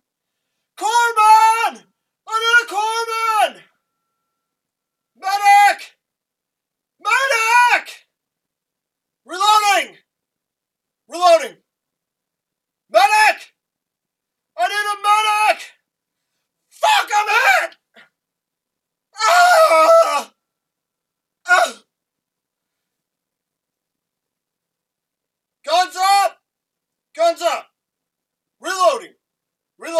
Speech > Solo speech
Complete Battlefields Vocal Sounds 1
Here is 1 of the complete set of soldiers yelling. This has some non uploaded sounds. Thought it might be easier for the consumer. Hope this helps with all your projects. If need a certain sound just reach out!
Scream, Battle, Vocal, War, Combat, Chatter, Army